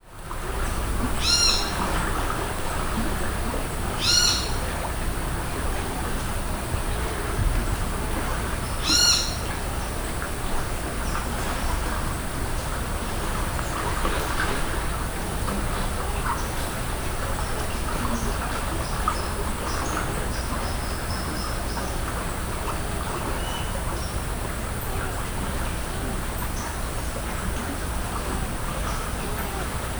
Soundscapes > Nature
Birds near a jungle stream (with Montezuma oropendola)
A small stream in the jungle near Boca Tapada, Costa Rica. Birds are singing, the wind is rustling in the trees, and at #0:43 you can hear a Montezuma oropendola. Recorded with an Olympus LS-14.
bird, birds, birdsong, flow, jungle, montezuma, oropendola, stream, water